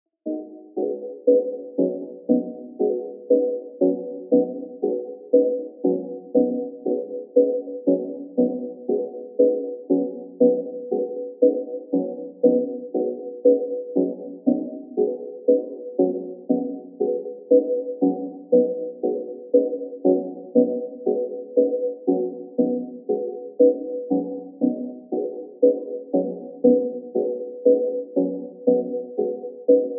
Music > Solo instrument
colorful atmosphere synth melody
synthesizer, effects, atmosphere, color, piano, melody, synth, bass, samples